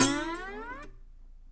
Music > Solo instrument
acoustic guitar slide1
acosutic, chord, chords, dissonant, guitar, instrument, knock, pretty, riff, slap, solo, string, strings, twang